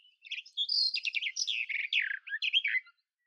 Sound effects > Animals
A recording of a Garden Warbler. Edited using RX11.
warbler, recording, birdsong, UK, Garden, field, nature, Bird